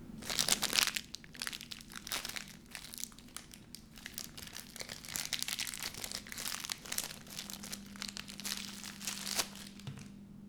Sound effects > Objects / House appliances
Opening a USB cable wrapped in plastic Own recording, Zoom H1n recorder
bag, computer-packaging, opening, opening-plastic, packaging, plastic, rustle, rustling, usb, usb-cable